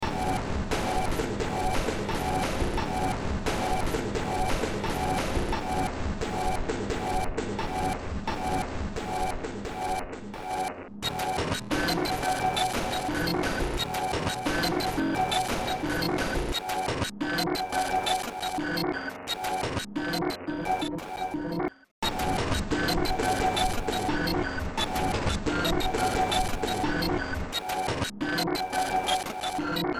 Music > Multiple instruments
Short Track #3065 (Industraumatic)
Ambient, Cyberpunk, Games, Horror, Industrial, Noise, Sci-fi, Soundtrack, Underground